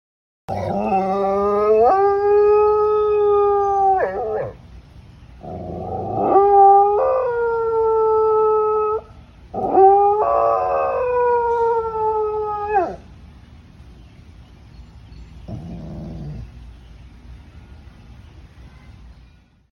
Sound effects > Animals
Dog howling in response to a siren heard earlier. Recorded with an iPhone 14 on 02/14/21. Filtered with Audacity. Rest in peace, Maggie. 11/28/25.